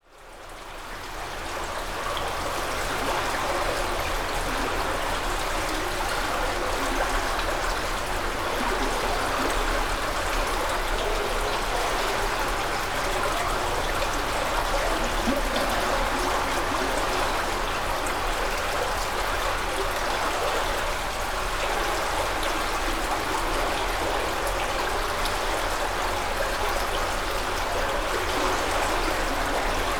Soundscapes > Nature
A recording of water from a small stream passing through a trash screen.
ambience, field, flowing, Re, recording